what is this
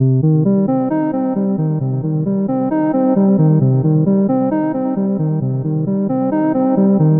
Music > Solo instrument
A short loop at 133 bpm. Soft, lulling Moog sound. Created in Reaper with LFO modulation. For sci-fi podcast intro/ending?
133bpm, electro, electronic, loop, moog, soft, synth